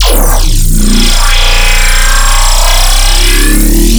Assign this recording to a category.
Instrument samples > Synths / Electronic